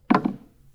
Sound effects > Other mechanisms, engines, machines
Dewalt 12 inch Chop Saw foley-042
Samples of my Dewalt Chopsaw recorded in my workshop in Humboldt County California. Recorded with a Tascam D-05 and lightly noise reduced with reaper
Blade, Chopsaw, Circularsaw, Foley, FX, Metal, Metallic, Perc, Percussion, Saw, Scrape, SFX, Shop, Teeth, Tool, Tools, Tooth, Woodshop, Workshop